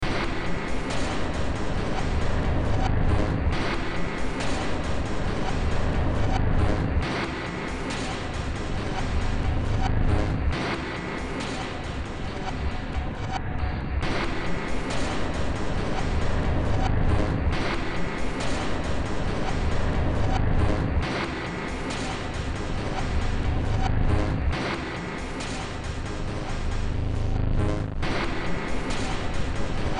Music > Multiple instruments
Short Track #3268 (Industraumatic)
Cyberpunk, Ambient, Horror, Soundtrack, Industrial, Underground, Games, Noise, Sci-fi